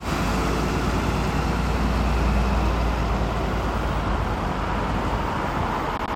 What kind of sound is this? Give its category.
Soundscapes > Urban